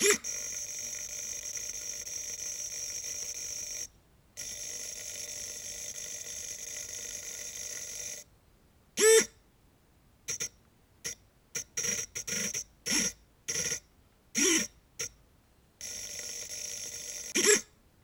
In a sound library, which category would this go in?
Sound effects > Human sounds and actions